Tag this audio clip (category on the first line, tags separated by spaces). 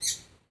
Sound effects > Animals
bird bird-chirp bird-chirping little-bird upset